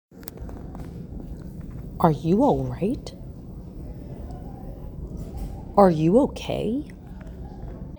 Speech > Solo speech
Are you alright? Are you ok?
Low female voice asking if someone is "alright" and "ok" as if after an accident
ok, Are-you-alright, are-you-ok, alright